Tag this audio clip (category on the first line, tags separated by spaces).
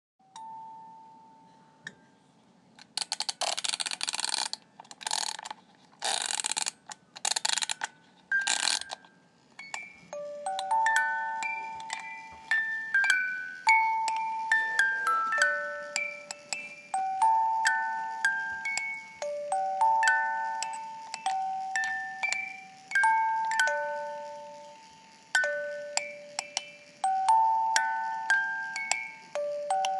Sound effects > Objects / House appliances
antique box music toy vintage